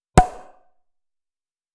Sound effects > Objects / House appliances
Open or Uncork Champagne Bottle 2
Pop of the uncorking of a champagne bottle.
Bottle, Champagne, Cork, Uncork